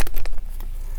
Sound effects > Other mechanisms, engines, machines
shop foley-037
knock, wood, oneshot, thud, bop, rustle, sound, bang, boom, tink, metal, fx, tools, little, percussion, strike, sfx, pop, bam, foley, perc, shop, crackle